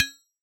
Sound effects > Objects / House appliances
Empty coffee thermos-005
percusive, recording, sampling